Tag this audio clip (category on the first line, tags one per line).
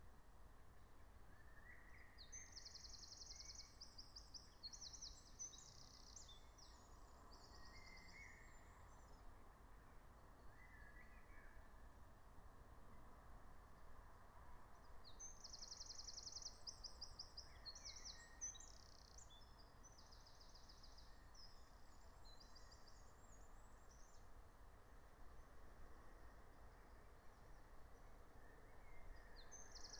Soundscapes > Nature
raspberry-pi
natural-soundscape
meadow
field-recording
soundscape
phenological-recording
nature
alice-holt-forest